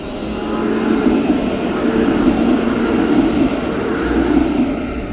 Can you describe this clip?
Sound effects > Vehicles
A tram passing by in Tampere, Finland. Recorded with OnePlus Nord 4.